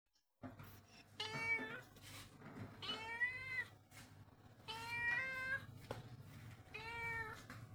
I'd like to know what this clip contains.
Sound effects > Animals
Recording cat calls from mobile
Cat, Animal, Growl